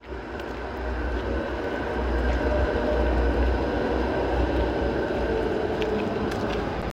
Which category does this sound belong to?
Soundscapes > Urban